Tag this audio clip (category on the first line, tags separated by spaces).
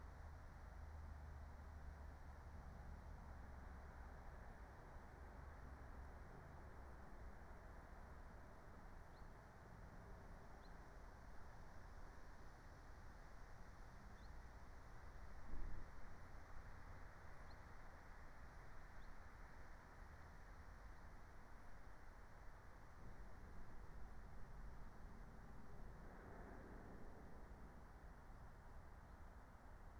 Soundscapes > Nature
alice-holt-forest phenological-recording raspberry-pi meadow natural-soundscape soundscape nature field-recording